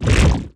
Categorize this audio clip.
Sound effects > Electronic / Design